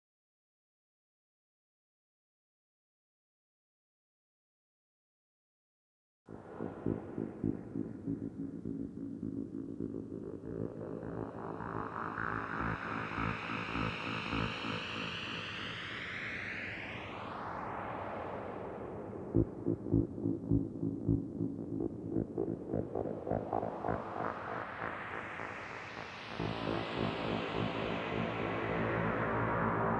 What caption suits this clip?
Soundscapes > Synthetic / Artificial
Soundscape dark
Dark sci-fi soundscape This sound was created from an original recording and transformed using various audio effects. The source was recorded in a real environment and then processed in post-production to achieve the final sound.
Cinematic, Scifi, Atmosphere, Mood, Free